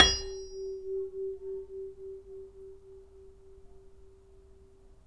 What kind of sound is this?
Sound effects > Other mechanisms, engines, machines
metal shop foley -034
pop, sfx, perc, bang, tools, little, rustle, tink, shop, strike, thud, foley, percussion, crackle, bam, metal, boom, wood, oneshot, sound, bop, fx, knock